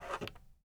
Sound effects > Other mechanisms, engines, machines
gun handle 2
Designed foley sound for less aggressive gun pickup from wooden table, with additional scrapes.
gun, soft, wood, table, scrape, handle, handgun